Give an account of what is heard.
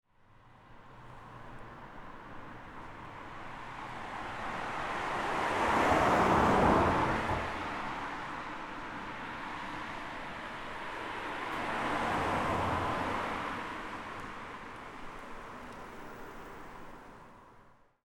Sound effects > Vehicles
Two car pass-bys under a bridge. #0:00 First car left to right #0:11 Second car right to left. Night recording with city ambience. Recorded with Zoom H5. No processing applied.